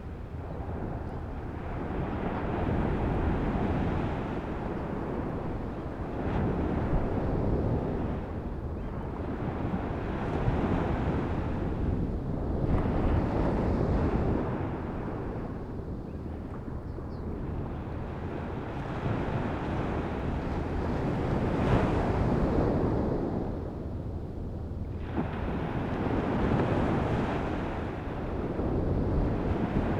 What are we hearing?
Soundscapes > Nature
WATRSurf-Gulf of Mexico Early Morning on the gulf, calm water, small waves, 6AM QCF Gulf Shores Alabama Sony M10
Early Morning on the Gulf of Mexico, breaking surf, calm waters, 6AM, summer